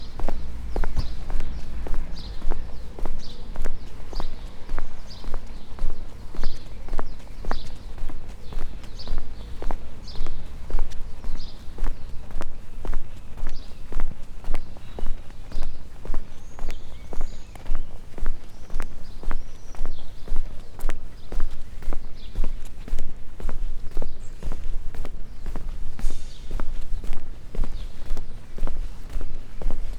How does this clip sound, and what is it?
Sound effects > Human sounds and actions
Walking concrete pavement - 250607 06h29 Albi All. de la culture
Subject : Walking across All. De la culture from just the crossing of the theatre to the public toilets. Date YMD : 2025 06 07 (Saturday). Early morning. Time = 06h29 Location : Albi 81000 Tarn Occitanie France. Hardware : Tascam FR-AV2, Rode NT5 with WS8 windshield. Had a pouch with the recorder, cables up my sleeve and mic in hand. Weather : Grey sky. Little to no wind, comfy temperature. Processing : Trimmed in Audacity. Other edits like filter, denoise etc… In the sound’s metadata. Notes : An early morning sound exploration trip. I heard a traffic light button a few days earlier and wanted to record it in a calmer environment.
2025, 81000, Albi, City, Early, Early-morning, France, FR-AV2, hand-held, handheld, Mono, morning, NT5, Occitanie, Outdoor, Rode, Saturday, Single-mic-mono, Tarn, Tascam, urbain, Wind-cover, WS8